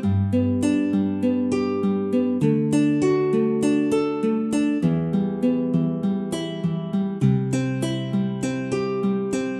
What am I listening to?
Music > Solo instrument
Happy Chords G-D-Em-C @ 100bpm
happy, 100bpm, upbeat, Progression, acoustic